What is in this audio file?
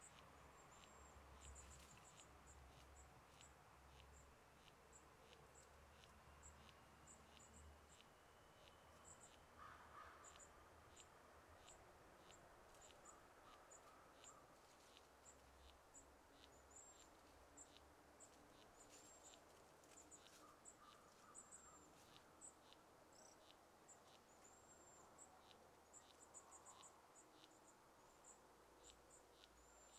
Soundscapes > Nature
Cedar waxwing near a cemetery
Cedar waxwings in trees and bushes nearby a cemetery. Tascam DR-60 LOM Uši Pro (pair)